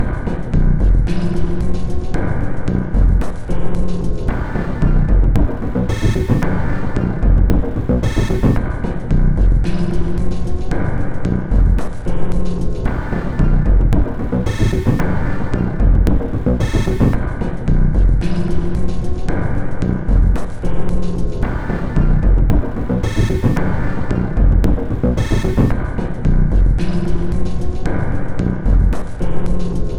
Instrument samples > Percussion
This 56bpm Drum Loop is good for composing Industrial/Electronic/Ambient songs or using as soundtrack to a sci-fi/suspense/horror indie game or short film.
Alien, Ambient, Dark, Drum, Industrial, Loop, Loopable, Packs, Samples, Soundtrack, Underground, Weird